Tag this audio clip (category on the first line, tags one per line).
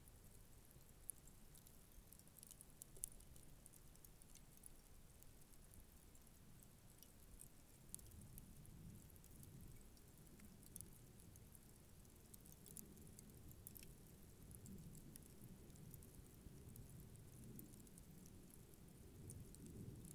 Soundscapes > Nature
crackle
burning